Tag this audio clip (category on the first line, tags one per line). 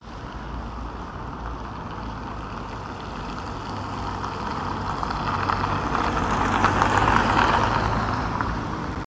Sound effects > Vehicles
car
road
tire